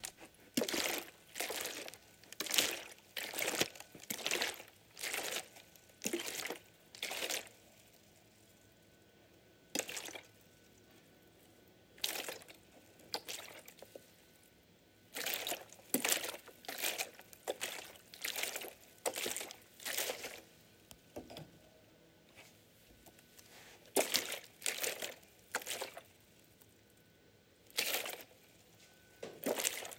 Sound effects > Natural elements and explosions
Walking in water sfx
WAter sounds from a plasctic bottle of water
liquid, splash, water